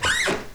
Sound effects > Objects / House appliances
Creaking Floorboards 04
bare-foot, creaking, creaky, floor, floorboard, floorboards, flooring, footstep, footsteps, going, grate, grind, groan, hardwood, heavy, old, old-building, room, rub, scrape, screech, squeak, squeaking, squeaky, squeal, walk, walking, weight, wood, wooden